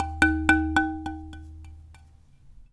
Music > Solo instrument
Marimba Loose Keys Notes Tones and Vibrations 8
block, foley, fx, keys, loose, marimba, notes, oneshotes, perc, percussion, rustle, thud, tink, wood, woodblock